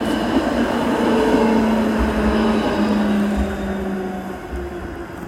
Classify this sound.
Sound effects > Vehicles